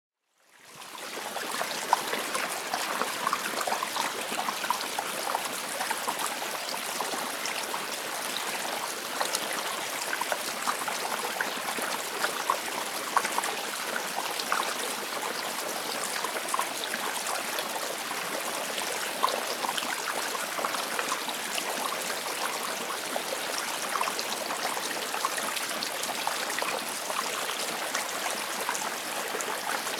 Sound effects > Natural elements and explosions
Gentle flow of water in and around rocks in a creek. Splashing, bubbling flow with soem croacking frogs in the later 1/3rd.